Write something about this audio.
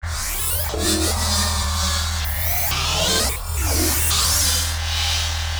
Sound effects > Electronic / Design
Shifting Alien Gears
Abstract, Alien, Analog, Automata, Buzz, Creature, Creatures, Digital, Droid, Drone, Experimental, FX, Glitch, Mechanical, Neurosis, Noise, Otherworldly, Robotic, Spacey, Synthesis, Trippin, Trippy